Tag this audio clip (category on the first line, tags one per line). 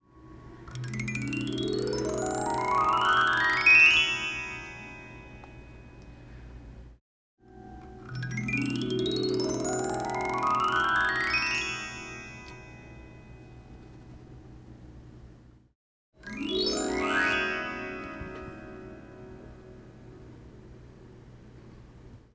Instrument samples > Other
box
bright
comb
harp
music
resonant